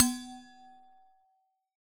Sound effects > Objects / House appliances
Resonant coffee thermos-023
percusive, recording, sampling